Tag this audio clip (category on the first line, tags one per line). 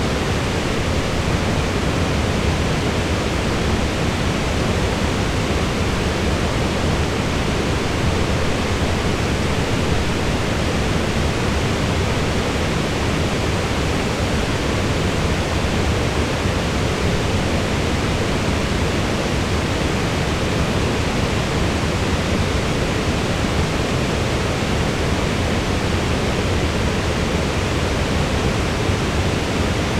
Sound effects > Natural elements and explosions
Albi
France
FR-AV2
hand-held
Mono
morning
water